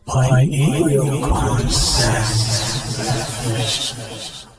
Speech > Solo speech
My echoing voice saying "I eat your kind of sadness for breakfast"
echo, speech, spoken, talk, vocal, voice